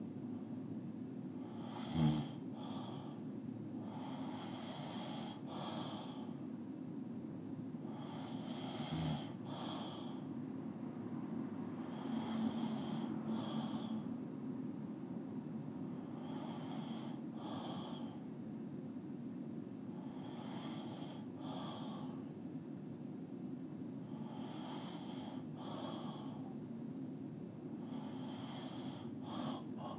Soundscapes > Indoors
A person sleeping and gently snoring. Occasional moving around. In the background there is some night time traffic and the general, inescapable drone of a city.